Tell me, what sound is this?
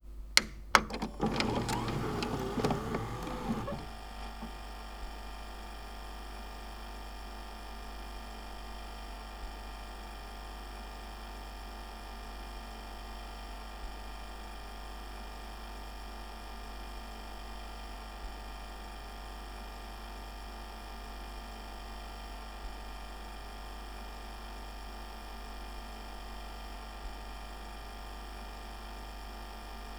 Sound effects > Electronic / Design
VHS plays. Samsung DVD_V6800
Play, Samsung, VCR, VHS